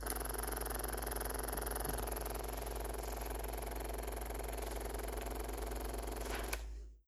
Sound effects > Objects / House appliances
A massager turning on, running at low speed and turning off. Quiet purring motor.